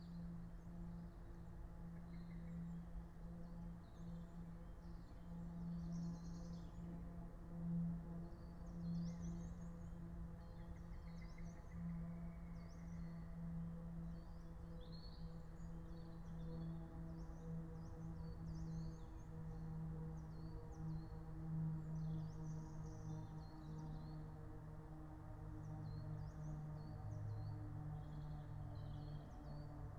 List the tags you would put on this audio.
Soundscapes > Nature
meadow alice-holt-forest natural-soundscape nature soundscape phenological-recording field-recording raspberry-pi

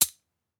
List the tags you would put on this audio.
Sound effects > Other mechanisms, engines, machines
clap garage hit noise sample

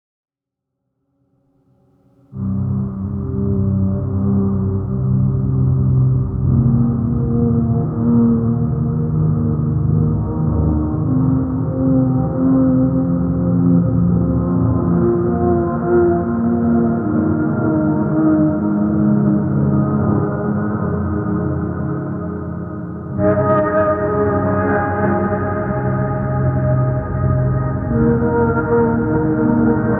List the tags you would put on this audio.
Music > Other
lofi reverb

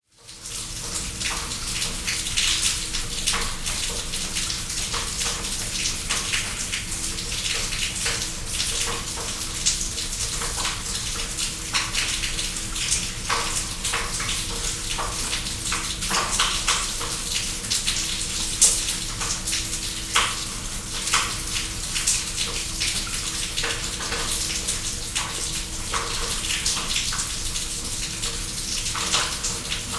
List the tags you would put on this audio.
Soundscapes > Urban
ambience Ambient atmosphere background background-sound Fieldrecording general-noise Parkinggarage raingutter sounddesign soundscape white-noise

